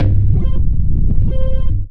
Instrument samples > Synths / Electronic
CVLT BASS 40
wobble, bassdrop, sub, subwoofer, synthbass, lfo, lowend, drops, clear, bass, subs, subbass, synth, stabs, wavetable, low